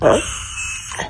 Sound effects > Animals
Dog yawning in the morning at iPhone 15 Pro camera inside an apartment room. Cut and normalized using Audacity.
adorable, dog, cute, animal, doggo, high-pitched, whine, malinois, yawn, puppy, yawning